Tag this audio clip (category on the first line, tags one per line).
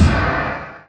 Instrument samples > Percussion
antimonarchy
atmospheric
bass
brass
bronze
clang
cling
crash
crashes
crashgong
cymbal
death-metal
drum
drums
gong
gongcrash
heavy
metal
metallic
percussion
percussive
pop
rock
staircase-like-fade-out
stairway-like-fade-out
steel
stepped
thrash